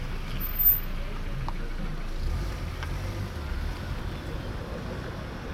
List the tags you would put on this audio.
Sound effects > Vehicles
Car Field-recording Finland

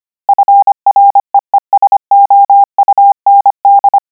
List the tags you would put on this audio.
Sound effects > Electronic / Design
code codigo letters morse radio

